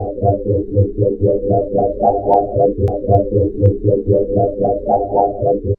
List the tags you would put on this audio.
Sound effects > Electronic / Design
electronic
pad
pulse
synth

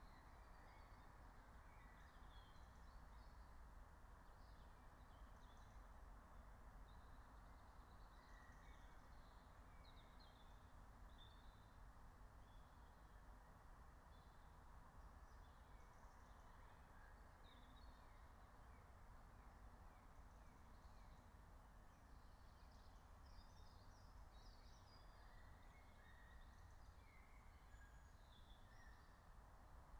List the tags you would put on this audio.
Soundscapes > Nature
field-recording
meadow
natural-soundscape
nature
raspberry-pi
soundscape

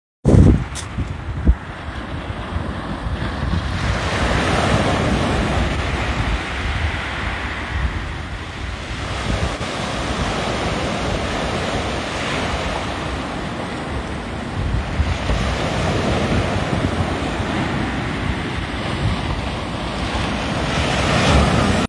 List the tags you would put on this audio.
Soundscapes > Nature
beach
sea
shingle
southwold
waves

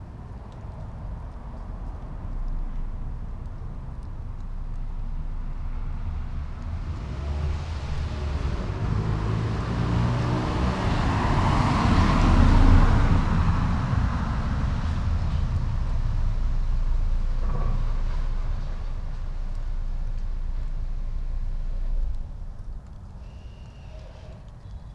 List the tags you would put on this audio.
Sound effects > Vehicles
automobile
car
car-driving
drive
driving
engine
vehicle

